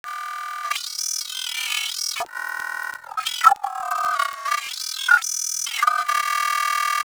Sound effects > Electronic / Design
delicate droid babble
From a collection of robotic alien glitch fx, sounds like animals insects and bugs from another planet. Some droning landscape glitch effects made with Fl studio, Wavewarper 2, Infiltrator, Shaperbox, Fabfilter, Izotope, processed via Reaper